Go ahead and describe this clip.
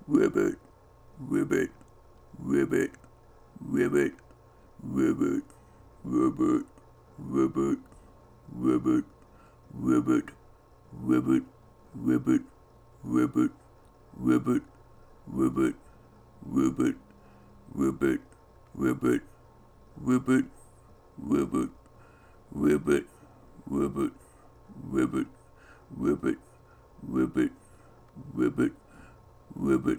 Sound effects > Animals
TOONAnml-Blue Snowball Microphone Low Frog Ribbit, Human Imitation, Cartoon Nicholas Judy TDC
A low frog ribbit. Human imitation. Cartoon.
human, frog, ribbit, low, imitation, Blue-Snowball, cartoon, Blue-brand